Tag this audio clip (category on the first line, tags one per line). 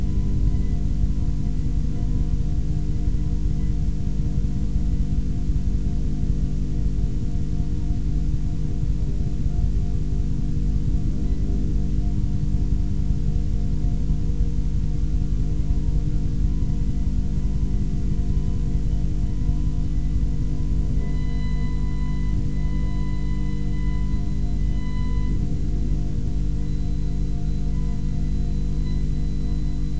Sound effects > Electronic / Design
Player
Hum
Cassette
Tape
Mains
Overtones